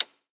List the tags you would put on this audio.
Sound effects > Human sounds and actions
off toggle interface activation switch button

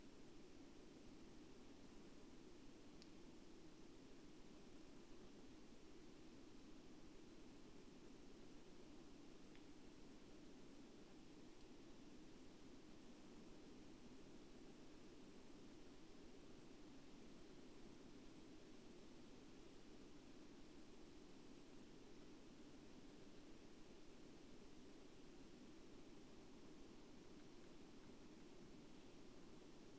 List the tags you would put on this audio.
Soundscapes > Nature
data-to-sound artistic-intervention weather-data sound-installation modified-soundscape natural-soundscape nature phenological-recording Dendrophone raspberry-pi alice-holt-forest soundscape field-recording

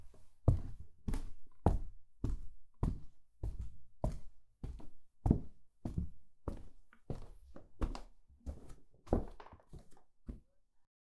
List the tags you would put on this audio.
Sound effects > Objects / House appliances
ascend foley

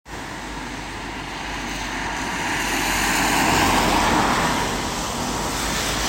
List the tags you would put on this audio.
Sound effects > Vehicles
field-recording tampere car

